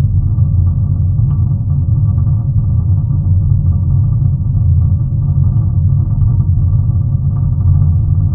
Sound effects > Objects / House appliances
Water dripping into the sink recorded with seismic sensors.
contact, dripping, leaf-audio, low-frequency, microphone, seismic, sensor, sink, water